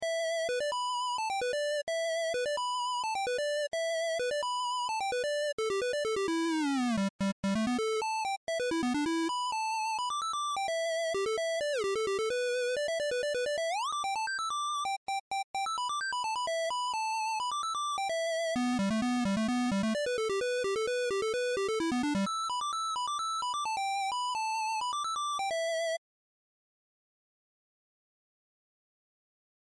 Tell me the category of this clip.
Music > Solo instrument